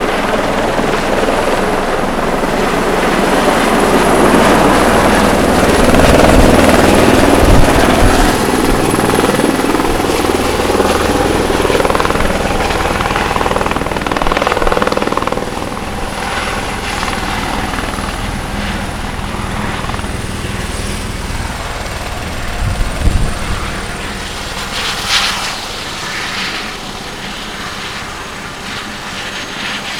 Soundscapes > Urban
Helicopter land at Riverside Methodist Hospital
Helicopter for emergency medical transport equipment EC-130 company name Medflight. Tail number not recorded. Helicopter is landing on pad; engine, blades slowing down. Recorded on ground level about 50 feet away by me on Zoom h1 essential.
aircraft, Helicopter, landing